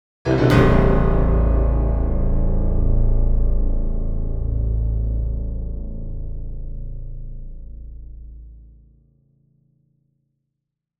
Music > Multiple instruments
Horror Sting (Harrowing String) 2
thrill, dylan-kelk, cinematic-sting